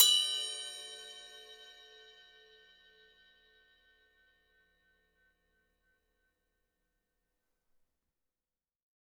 Music > Solo instrument
Cymbal hit with knife-004
Crash, Custom, Cymbal, Cymbals, Drum, Drums, FX, GONG, Hat, Kit, Metal, Oneshot, Paiste, Perc, Percussion, Ride, Sabian